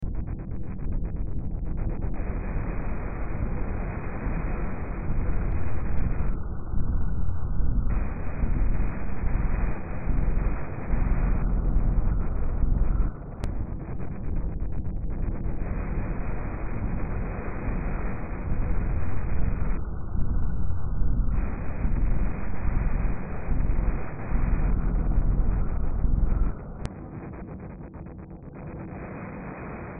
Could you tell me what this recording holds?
Music > Multiple instruments
Demo Track #3304 (Industraumatic)
Ambient, Cyberpunk, Games, Horror, Industrial, Noise, Sci-fi, Soundtrack, Underground